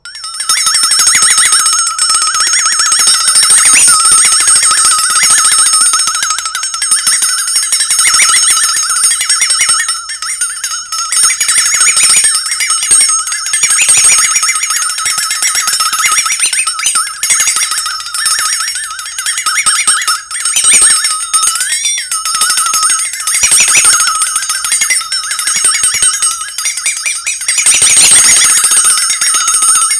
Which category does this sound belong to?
Instrument samples > Percussion